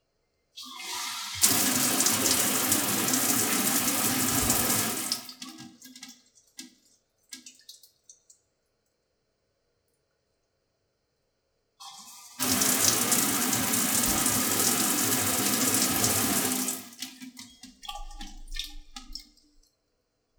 Sound effects > Objects / House appliances
Shower Turning On / Off
Turning my shower on and off. Recorded on Zoom H6 and Rode Audio Technica Shotgun Mic. I attempted to fix the background noise using Adobe Audition, which is why it sounds like its missing some frequencies.
Water, Bath, Dripping, Tap, drain, Bathroom, Shower